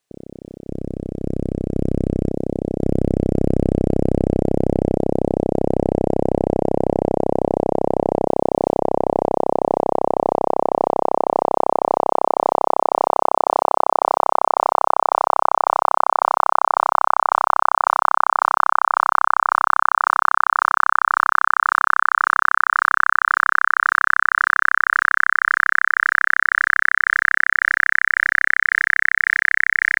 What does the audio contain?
Instrument samples > Synths / Electronic
07. FM-X RES2 SKIRT7 RES0-99 bpm110change C0root
MODX, FM-X, Yamaha, Montage